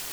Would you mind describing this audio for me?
Sound effects > Experimental
Static cutting in
Made from simply distorting an audio file, works well in a scene if you need to flash something on a display. Used in my visual novel: R(e)Born_ Referenced with AKG K240.
corruption, cut, electronic, glitch, noise, static